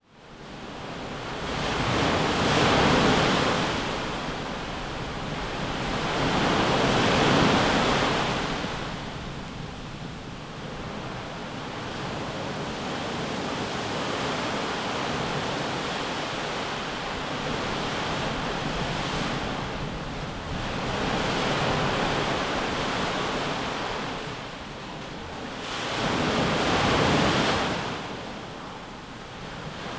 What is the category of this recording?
Soundscapes > Nature